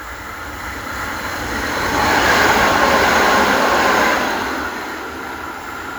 Soundscapes > Urban
A Tram driving by at high speed in Hervanta/Hallila, Tampere. Some car traffic or wind may be heard in the background. The sound was recorded using a Samsung Galaxy A25 phone